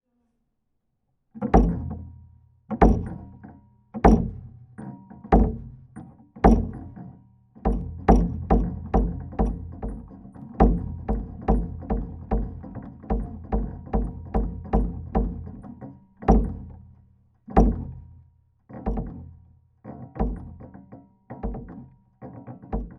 Instrument samples > Percussion
MUSCInst-ContactMic Kicking kick SoAM SoundofSolidandGaseous Pt 1
beat, drum, drums, kick, percussion, percussive